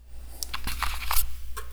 Sound effects > Objects / House appliances
aluminum can foley-002
alumminum, can, foley, fx, household, metal, scrape, sfx, tap, water